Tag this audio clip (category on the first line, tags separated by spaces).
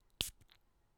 Sound effects > Objects / House appliances
perfume scent